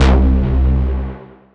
Instrument samples > Synths / Electronic
CVLT BASS 131
bassdrop, clear, drops, low, sub, subs, synth, wavetable, wobble